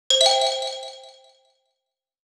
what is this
Sound effects > Electronic / Design
GAME UI SFX PRACTICE 8
Program : FL Studio, Sonatina Orchest
computer, machine, soundeffect, UI